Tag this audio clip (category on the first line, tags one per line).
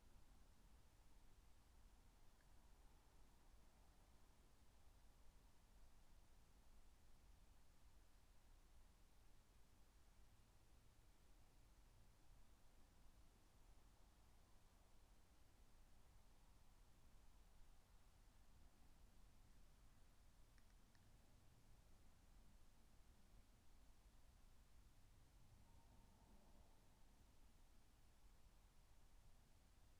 Soundscapes > Nature
raspberry-pi
alice-holt-forest
weather-data
sound-installation
data-to-sound
modified-soundscape